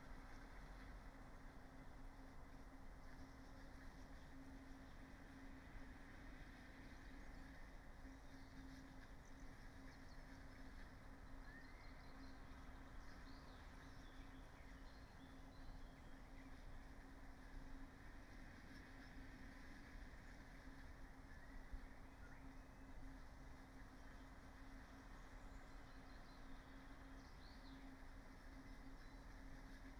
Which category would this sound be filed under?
Soundscapes > Nature